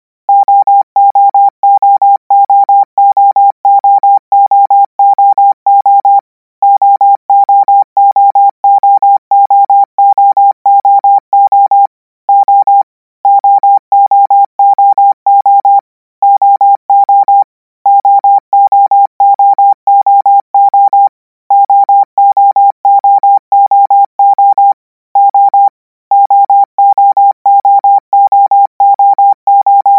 Sound effects > Electronic / Design
Koch 10 O - 200 N 25WPM 800Hz 90%
Practice hear letter 'O' use Koch method (practice each letter, symbol, letter separate than combine), 200 word random length, 25 word/minute, 800 Hz, 90% volume.